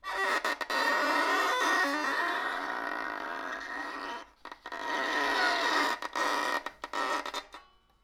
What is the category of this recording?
Instrument samples > String